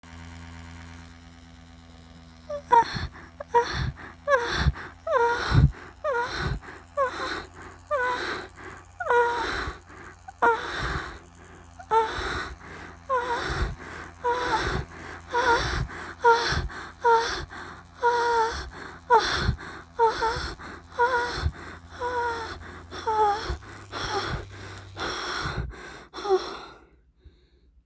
Sound effects > Human sounds and actions
Using a new toy with my vibrator
Trying out a new "monster" dildo I got, 5/5 stars! Sorry about the vibrator noise.